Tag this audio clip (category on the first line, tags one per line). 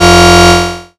Instrument samples > Synths / Electronic
additive-synthesis
fm-synthesis